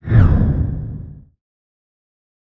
Sound effects > Other
Sound Design Elements Whoosh SFX 017
element, elements, fast, movement, production, swoosh, trailer